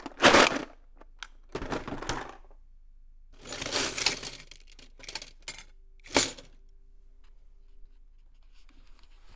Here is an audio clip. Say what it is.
Sound effects > Other mechanisms, engines, machines
shop foley-011
bam, oneshot